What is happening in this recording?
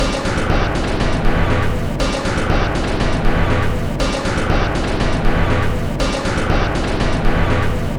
Instrument samples > Percussion
This 120bpm Drum Loop is good for composing Industrial/Electronic/Ambient songs or using as soundtrack to a sci-fi/suspense/horror indie game or short film.
Alien, Ambient, Dark, Drum, Industrial, Loop, Loopable, Packs, Samples, Soundtrack, Underground, Weird